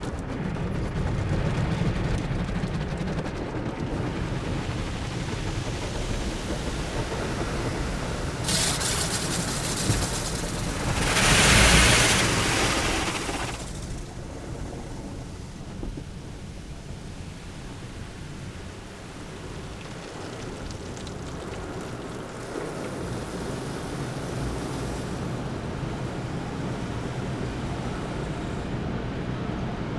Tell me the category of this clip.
Sound effects > Other mechanisms, engines, machines